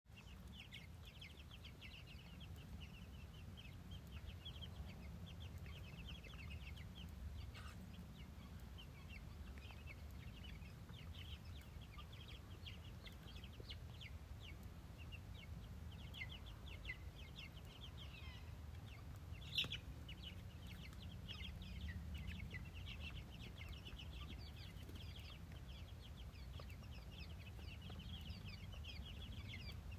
Soundscapes > Nature
Chicks in a backyard 08/19/2024
Sound of chicks in the backyard
farm, birds, chicks